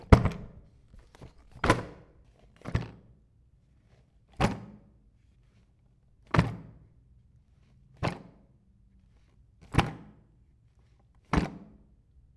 Sound effects > Objects / House appliances
item drop tool bag
Dropping a small toolcase of the size of an agenda. Has a zipper. Case is dropped in a wooden surface inside a cabinet for less reverb. Recorded with Zoom H2.
case, drop, soft, tool